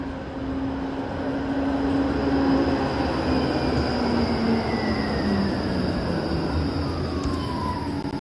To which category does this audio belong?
Sound effects > Vehicles